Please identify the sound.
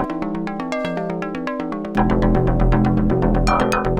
Music > Solo percussion
Industrial Estate 44
From a pack of samples created with my modular system and optimized for use in Ableton Live. The "Industrial Estate" loops make generous use of metal percussion, analog drum machines, 'micro-sound' techniques, tape manipulation / digital 'scrubbing', and RF signals. Ideal for recycling into abrasive or intense compositions across all genres of electronic music.